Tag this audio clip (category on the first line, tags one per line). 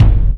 Instrument samples > Percussion
cylindrical
tom
Japan
bass
taiko
drums
unsnared
floor-1
percussion
tom-tom
wadaiko
drum